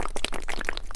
Sound effects > Other mechanisms, engines, machines
shop foley-034
percussion, bop, foley, bang, tink, knock, bam, sound, little, wood, thud, crackle, boom, sfx, metal, perc, oneshot, fx, rustle, shop, strike, pop, tools